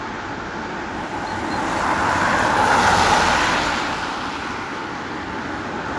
Soundscapes > Urban
A car driving by in Hervanta, Tampere. Some wind may be heard in the background. The sound was recorded using a Samsung Galaxy A25 phone
Car, field-recording, Drive-by